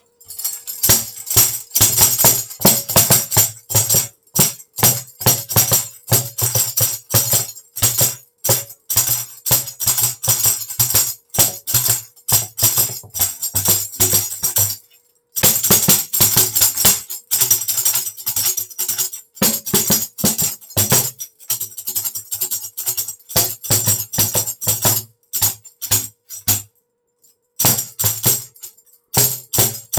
Instrument samples > Percussion
Boogie-march beats of Rock-tambourine
Alex plays the tambourine. Use for your music pieces, songs, compositions, musicals, operas, games, apps
march, rythm, tambourine